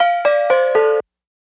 Music > Other
A simple downward arpeggiated bell motif, quick and simple for a stinger, or opening theme. Produced on a Korg Wavestate, mastered at -3dBu in Pro Tools.
sound-design, soundeffect, jingle, ui, bump, chime, sfx, game, motif, sound-logo, bumper, effect, bell, stinger